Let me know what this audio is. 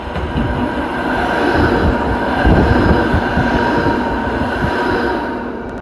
Soundscapes > Urban
ratikka9 copy
traffic, tram, vehicle